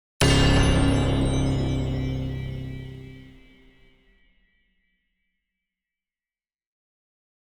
Sound effects > Electronic / Design
A retro 1980s style sting / stab (or stinger) to use for an accent, transition, or FX. I would love to hear how you put the sound to work.